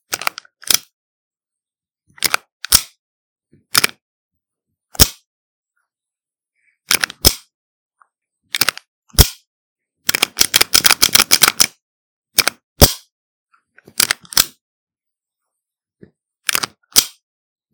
Sound effects > Other mechanisms, engines, machines
Small pocket pistol slide racking sound effects. Multiple samples in one file. Recorded on a phone microphone, Mono channel.
Foley, Gun, Pistol